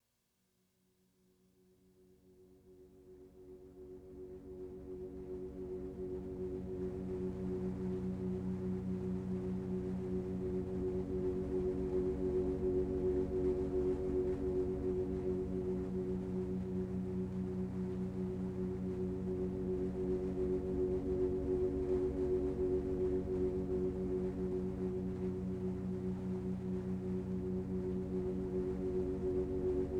Music > Solo instrument
Let, somebody
Ambient pad sound
ambient, dreamy, pad, synth, tape